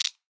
Sound effects > Human sounds and actions
A clean, mechanical switch sound featuring a quick click followed by a subtle snap, ideal for toggles, buttons, or power controls.